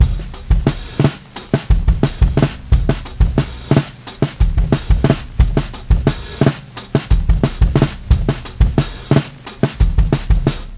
Music > Solo percussion
bb drum break loop fire1 89
A short set of Acoustic Breakbeats recorded and processed on old tape. All at 89 BPM
89BPM, Acoustic, Break, Breakbeat, Drum, DrumLoop, Drums, Drum-Set, Dusty, Lo-Fi, Vintage, Vinyl